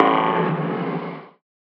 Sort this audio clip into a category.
Sound effects > Electronic / Design